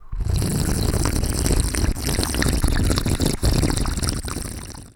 Sound effects > Human sounds and actions
Sipping from a Cup of Tea
Sipping from the morning cup of tea and making as much noise as possible
cup, drink, liquid, sip, sipping, tea